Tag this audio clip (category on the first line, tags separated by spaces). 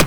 Instrument samples > Percussion
Drum Original Percussion Snare